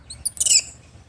Sound effects > Animals
Primates - Geoffroy's Spider Monkey
Recorded with an LG Stylus 2022 at Hope Ranch.
jungle, monkey, primate, rainforest, spider-monkey